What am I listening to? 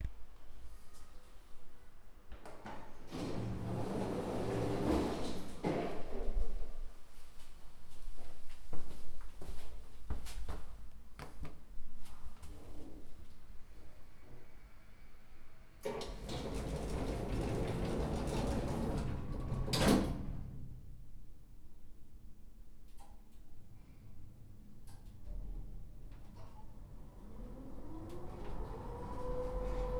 Soundscapes > Urban
Elevator noises
Me taking the elevator up and down, you can hear the doors opening and closing and some elvator noises as it goes up and down.